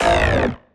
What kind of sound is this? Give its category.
Sound effects > Experimental